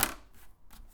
Sound effects > Other mechanisms, engines, machines
metal shop foley -156
shop, strike, bop, sfx, thud, bang, sound, percussion, knock, little, oneshot, foley, pop, boom, perc, tink, bam, wood, fx, tools, metal, crackle, rustle